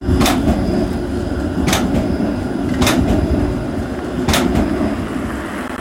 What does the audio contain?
Sound effects > Vehicles
A tram passing by from distance on Insinöörinkatu 23 road, Hervanta aera. Recorded in November's afternoon with iphone 15 pro max. Road is dry.